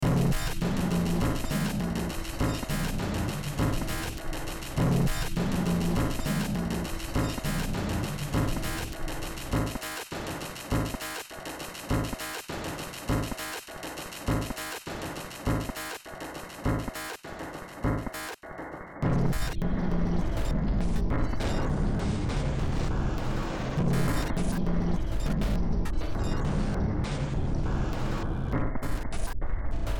Music > Multiple instruments
Demo Track #3703 (Industraumatic)
Soundtrack, Cyberpunk, Industrial, Sci-fi, Horror, Games, Ambient, Noise, Underground